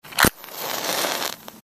Sound effects > Objects / House appliances
Light a match - to making of fire.